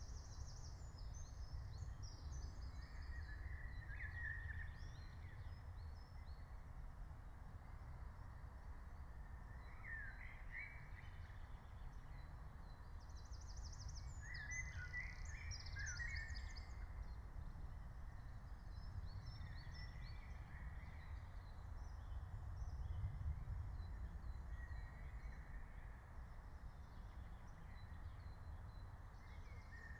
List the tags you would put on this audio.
Soundscapes > Nature
alice-holt-forest natural-soundscape phenological-recording soundscape

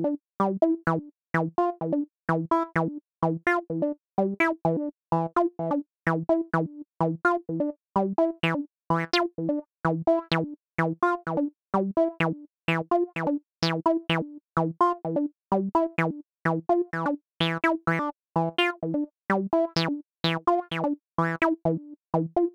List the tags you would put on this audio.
Music > Solo instrument
303 Recording Roland hardware techno TB-03 Acid electronic house synth

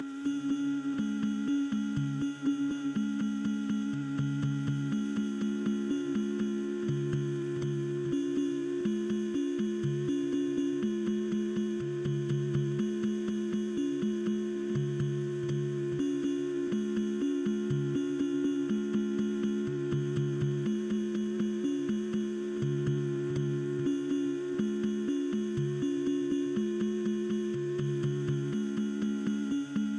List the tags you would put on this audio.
Music > Multiple instruments
background-music blocky chunky drone frutiger-aero loop notes synth tape tape-cassette tape-drone

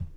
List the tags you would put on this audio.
Sound effects > Objects / House appliances
handle object cleaning knock shake liquid scoop household bucket tool pour water container plastic drop slam spill

